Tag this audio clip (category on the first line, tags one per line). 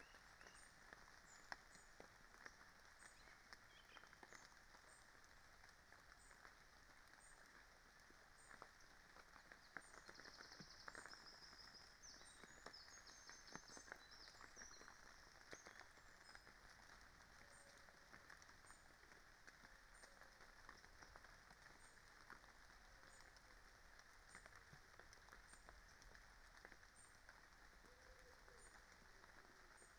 Soundscapes > Nature
nature
phenological-recording
data-to-sound
field-recording
modified-soundscape
alice-holt-forest
sound-installation
natural-soundscape
weather-data
raspberry-pi
soundscape
artistic-intervention
Dendrophone